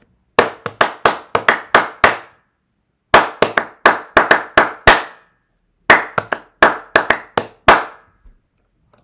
Instrument samples > Percussion
Hand clap. (MacBookAirM1 microphone in Reaper’s DAW)